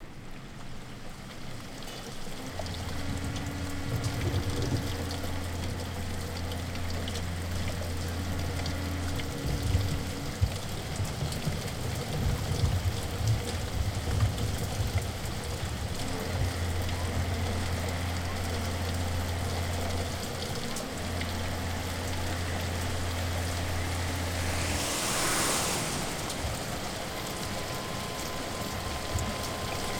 Soundscapes > Urban
Grimsby - 5am
I've always loved an audio vignette, ever since the early days of reel-to-reel. This is Grimsby, 5am, on any winter night. Enjoy. Rain, thunder, ship whistles, hooters, trains, cars, dogs, motor vehicles. compressors, and anything else you might find around a dock or port at 5am. 10 minutes +, nice clean recordings. Made from found PD samples, mixed and mastered using Cakewalk Sonar, a host of plugins, and Goldwave.
Stereo, Cityscape, Fx